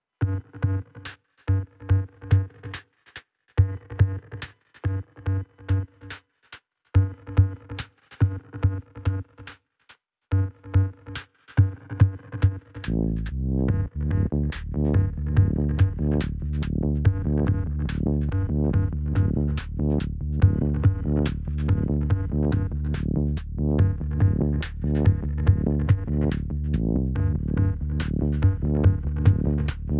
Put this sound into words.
Music > Multiple instruments
clockwise works- BUMP
1-shot-improvisation with digital rhythm (Casio PT-31 synth+Zoom 9030 multieffect) and synthbass (Roland Juno-106) played live with no quantization..recorded and mixed with Ableton 11